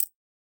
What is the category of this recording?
Sound effects > Objects / House appliances